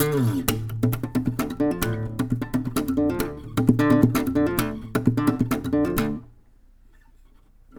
Music > Solo instrument
acoustic guitar slap and pop riff
chord, pretty, instrument, riff